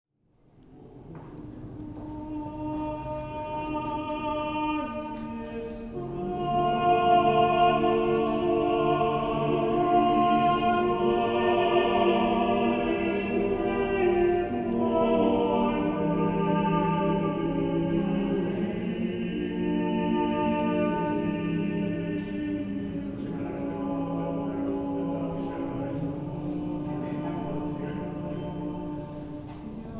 Music > Other
Male vocal ensemble (Basil Church - Moscow - Russia)
Recorded in Moscow. October 2014. Sony PCM D50 <3
Church, Male, Moscow, Russia, Vocal